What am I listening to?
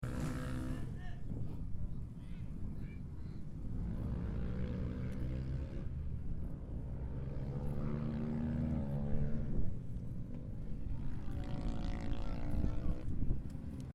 Soundscapes > Other
Supermoto Polish Championship - May 2025 - vol.10 - Racing Circuit "Slomczyn"
Recorded on TASCAM - DR-05X; Field recording on the Slomczyn racetrack near Warsaw, PL; Supermoto Championship.